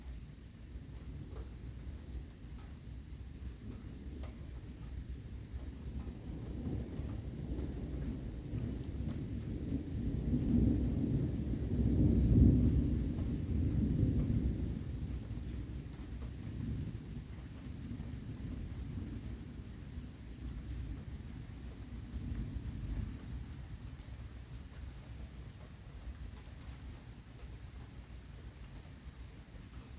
Soundscapes > Nature
STORM-Samsung Galaxy Smartphone Thunderstorm, Heavy Rain, Distant Booming Thunder Roll Nicholas Judy TDC
A thunderstorm with heavy rain and distant booming rolls.
distant, rain, boom, roll, thunderstorm, Phone-recording, heavy